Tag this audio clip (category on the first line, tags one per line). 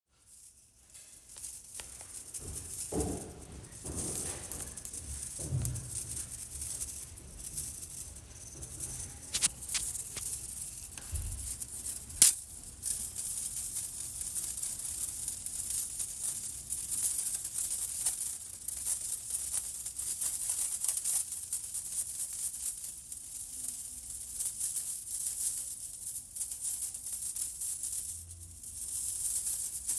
Sound effects > Objects / House appliances
crinkling
tinkle
wire
tinkling
crisp
sparkling
metal
shaking